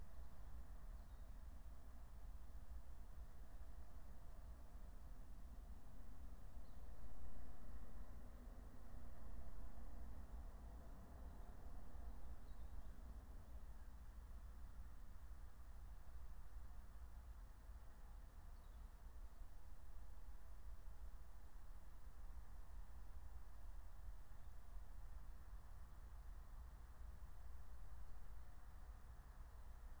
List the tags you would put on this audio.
Soundscapes > Nature
nature
alice-holt-forest
field-recording
natural-soundscape
phenological-recording
meadow
soundscape
raspberry-pi

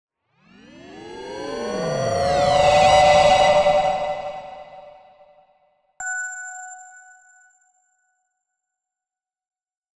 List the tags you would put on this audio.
Sound effects > Other
cinematic
cold
dark
design
effect
film
filmscore
game
impact
movie
riser
sfx

